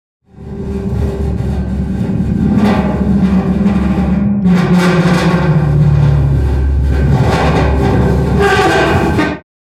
Sound effects > Other
Raw Industrial Recordings-Scratching Metal 009
rusted, sfx, foley, industrial, metal, rust, distorted, drone, experimental, noise, metallic, scraping, abstract, sound, clang, effects, sounds, impact, scratching, mechanical, textures, raw, found, cinematic, harsh, grungy